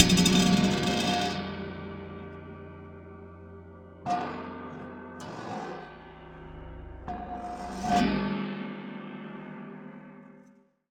Music > Solo instrument
Gong Cymbal-003
Crash, Custom, Cymbal, Cymbals, Drum, Drums, FX, GONG, Hat, Kit, Metal, Oneshot, Paiste, Perc, Percussion, Ride, Sabian